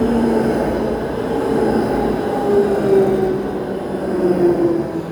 Soundscapes > Urban
What: Tram passing by sound Where: in Hervanta, Tampere on a cloudy day Recording device: samsung s24 ultra Purpose: School project